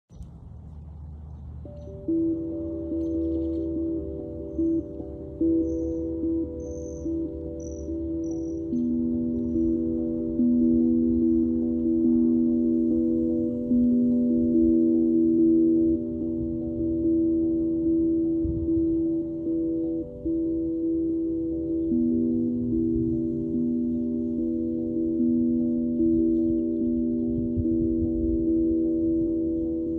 Music > Solo instrument
Remembering Me - Ambient Tongue Drum
Ambient, Dreamscape